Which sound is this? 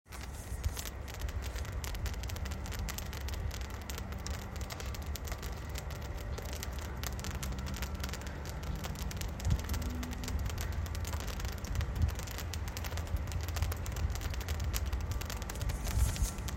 Sound effects > Natural elements and explosions
Static Snow
Recording of melting snow dripping off the roof of Neilson Library at Smith College in Northampton, MA. The melted snow was hitting a layer of snow on the ground, creating the static/popping noise. Recording taken with an iPhone.
winter, pop, crackle, static